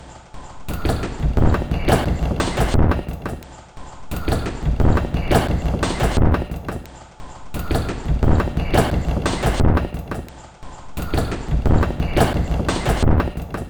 Instrument samples > Percussion
This 140bpm Drum Loop is good for composing Industrial/Electronic/Ambient songs or using as soundtrack to a sci-fi/suspense/horror indie game or short film.
Samples, Drum, Dark, Loopable, Alien, Industrial, Weird, Packs, Underground, Loop, Ambient, Soundtrack